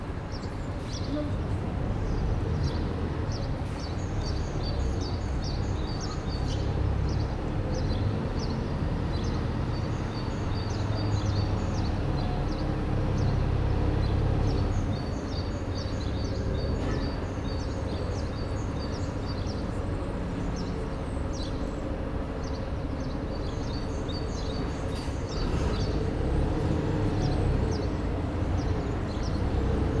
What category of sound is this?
Soundscapes > Urban